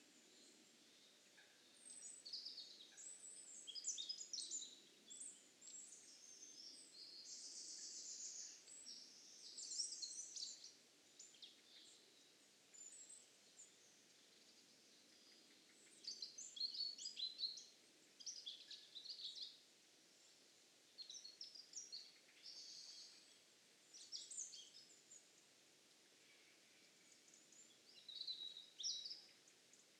Soundscapes > Nature
19 10 25 Autumnal check
Autumn has begun and I went to check which species have not abandoned the territory. Stationary and aquatic birds